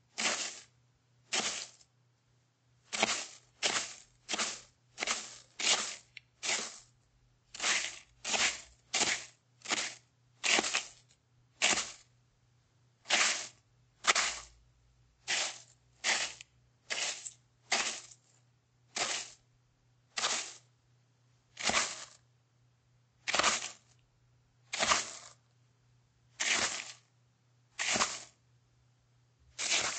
Sound effects > Other
Cartoon Digging (Pack)
Audio effect pack of cartoon digging. This sound I recorded with my phone (Pixel XL). So ... we baked homemade Meringue (baiser), and overdried 😅️ and it crumbles, and when we put it in a bowl I heard that he makes noise reminding of digging, but not the real one, but this sound was sounds more like cartoony, so ... I just took a small bamboo skewer, and stard digging in this overdryed meringue, and I recodred this sound.
cartoon, dig, digging, dirt, gravel, soil